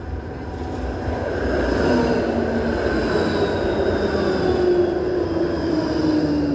Sound effects > Vehicles
Tram sound in Tampere Hervanta Finland